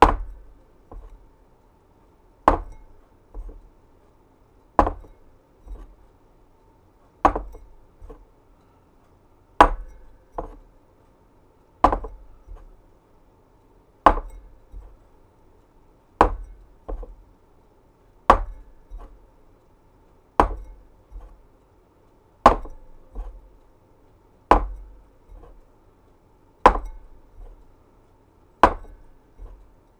Sound effects > Objects / House appliances
CERMHndl-Blue Snowball Microphone, CU Bowl, Ceramic, Pick Up, Put Down Nicholas Judy TDC
A ceramic bowl picking up and putting down.